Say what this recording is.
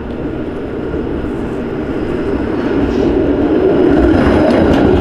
Sound effects > Vehicles
Tram00042540TramPassing
vehicle, city, field-recording, transportation, tram, winter, tramway